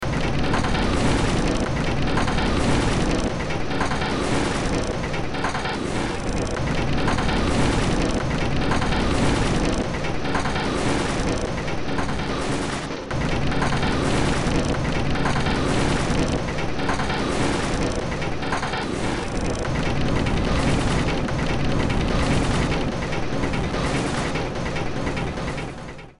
Music > Multiple instruments
Short Track #3479 (Industraumatic)
Ambient, Cyberpunk, Games, Horror, Industrial, Noise, Sci-fi, Soundtrack, Underground